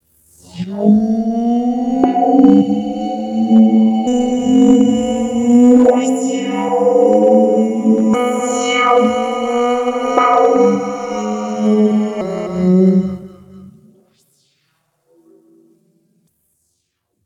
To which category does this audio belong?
Speech > Processed / Synthetic